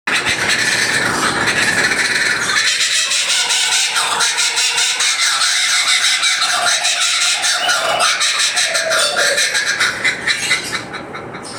Sound effects > Animals
Kingfishers - Laughing Kookaburra; Two Calling
Recorded with an LG Stylus 2022 at Hope Ranch. These are laughing kookaburras, an Australian kingfisher whose call is often used as a stock jungle sound effect in jungles taking place outside their native Australian habitat.
kingfisher, australia, jungle, aviary, bird, kookaburra, exotic, australian-birds